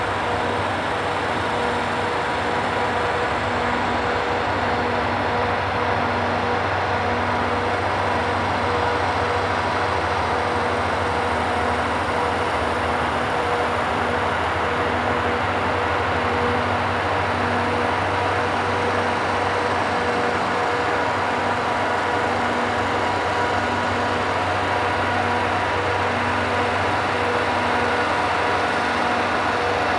Soundscapes > Urban
Snow cannon at Tallinn Song Festival Grounds Sound Devices MixPre-6 II; Schoeps MiniCMIT GR Noise Assist set to 0 dB. Relatively calm wind conditions. No post-processing applied. The microphone was placed on a small stand in front of the G. Ernesaks statue, slightly above knee height. At the same time, artificial snow production was taking place in front of the Song Festival Arch. The distance between the microphone and the snow cannon was approximately 180 meters. Temperature: −7 °C Recorded on January 20, 2026, at 08:45 AM. The snow cannon is operating. ### 🇪🇪 Eesti keeles Lumekahur Tallinna Lauluväljakul. Sound Devices MixPre-6 II; Schoeps MiniCMIT GR N.Asst 0 dB, suhteliselt tuulevaikne, ilma järeltöötluseta. Mikrofon asus väikesel statiivil G.Ernesaksa kuju jalge ees, põlvedest veidi kõrgemal. Samal ajal toimus lume tootmine laulukaare ees, distantsil ca 180 meetrit mikrofonist lumekahurini. Temperatuur -7 kraadi C; salvestatud 20.jaanuaril 2026.a. hommikul kell 8:45.
Tallinna, The, snow, Lauluvljakul, Lumekahur